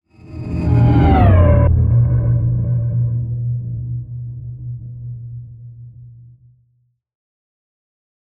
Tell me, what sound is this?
Sound effects > Other
Sound Design Elements Whoosh SFX 030
ambient, audio, cinematic, design, dynamic, effect, effects, element, elements, fast, film, fx, motion, movement, production, sound, sweeping, swoosh, trailer, transition, whoosh